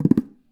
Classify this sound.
Sound effects > Other mechanisms, engines, machines